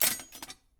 Sound effects > Other mechanisms, engines, machines
metal shop foley -188
bang, bop, crackle, thud, pop, knock, oneshot, wood, tink, little, strike, percussion, fx, foley, metal, tools, sound, bam, rustle, perc, boom, shop, sfx